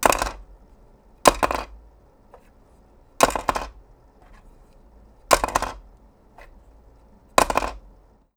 Sound effects > Objects / House appliances
Sunglasses being dropped.